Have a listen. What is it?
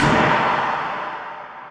Instrument samples > Percussion
A true deepcrash and maincrash for maindrum use. A crash chord from older crashfiles (see my crash folder).
China, sinocymbal, clang, crash